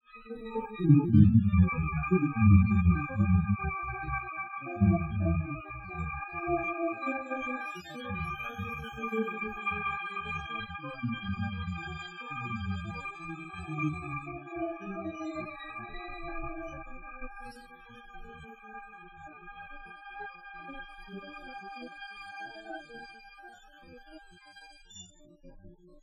Sound effects > Experimental
Subway Stop In Station (Stretched Version)
Recorded with Zoom H6 XY-Microphone. Subway entering a station in Athens / Greece. Edited with PaulXStretch.